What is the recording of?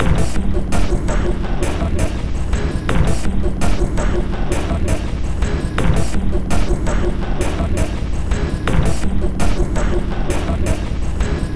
Instrument samples > Percussion
This 166bpm Drum Loop is good for composing Industrial/Electronic/Ambient songs or using as soundtrack to a sci-fi/suspense/horror indie game or short film.
Alien, Ambient, Dark, Drum, Industrial, Loop, Loopable, Packs, Samples, Soundtrack, Underground, Weird